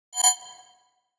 Instrument samples > Percussion
made in ableton through operator snare snare loops snare oneshots drums percussion